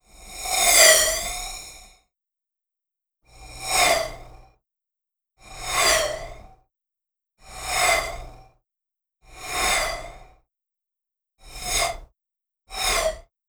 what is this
Sound effects > Electronic / Design
DSGNWhsh-Blue Snowball Microphone, CU Straw Whooshes, Multiple Takes Nicholas Judy TDC
Straw whooshes. Multiple takes.
straw, Blue-brand, whoosh, Blue-Snowball, swoosh